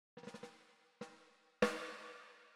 Music > Solo percussion
snare Processed - slow fill end - 14 by 6.5 inch Brass Ludwig
snaredrum, rim, rimshots, sfx, snare, hits, hit, acoustic, percussion, snareroll, realdrum, ludwig, reverb, drums, snares, realdrums, fx, rimshot, crack, kit, processed, roll, perc, drum, beat, oneshot, drumkit, flam, brass